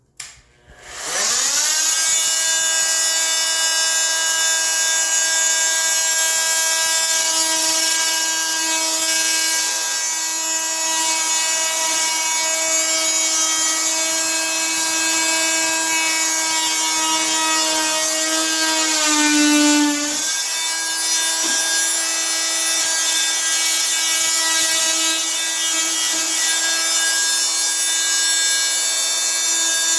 Sound effects > Objects / House appliances
TOOLPowr-Samsung Galaxy Smartphone, CU Saw, Paddle, Start, Cut Wall, Buzz, Turn Off Nicholas Judy TDC
A paddle saw starting, cutting wall and turning off.